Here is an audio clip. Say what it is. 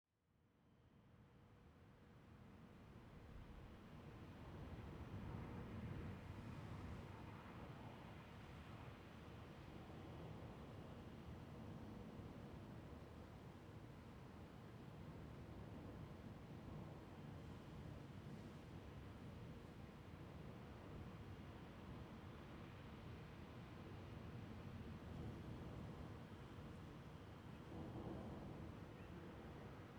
Soundscapes > Nature
Thunderstorm - 2025-05-03 17.50 (5:50pm)
This is my first long recording with my new Shure MV88+ Video Kit which is capable to record stereo field recordings. I haven't edited much but only cut the boring start and ending of the sample. No filters were applied but a subtle normalisation so both stereo channels are in equal volume. If you want to use it you have to remove some wind or other disturbing sounds for yourself.
MV88, rain, Shure, thunder, thunderstorm